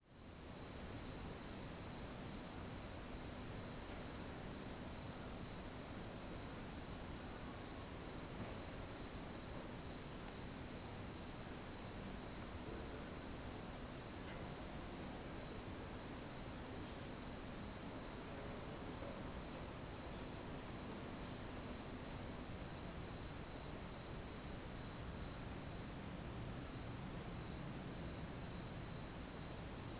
Soundscapes > Nature
Evening countryside atmosphere with crickets. Field recording on the island of Ikaria, Greece.